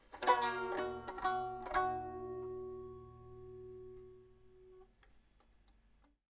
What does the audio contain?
Instrument samples > String
Plucking broken violin string 9
Plucking the string(s) of a broken violin.
beatup, creepy, horror, pluck, string, strings, unsettling, violin